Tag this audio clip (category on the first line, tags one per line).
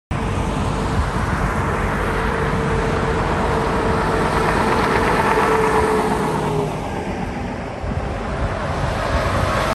Sound effects > Vehicles
highway
road
truck